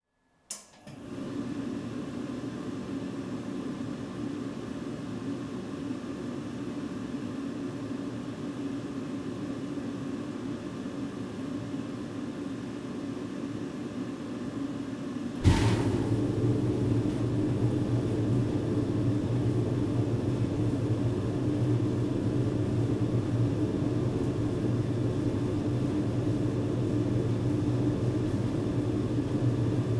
Sound effects > Other mechanisms, engines, machines
Domestic boiler firing up
A domestic floor-heating oil system boiler ignites, runs, and stops when the cycle is complete, and the water starts circulating beneath ceramic floor tiles. The boiler fan kicks in once the fuel starts burning in the chamber.
boiler, ignition, running, starting